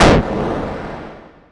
Instrument samples > Synths / Electronic
CVLT BASS 95
subs, wavetable, clear, synthbass, synth, bassdrop, subwoofer, bass, low, sub, stabs, wobble, lfo, lowend, subbass, drops